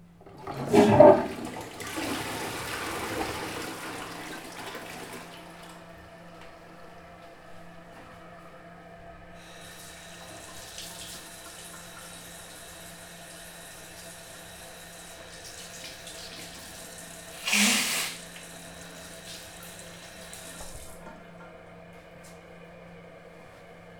Sound effects > Natural elements and explosions
Toilet flush, DR-40x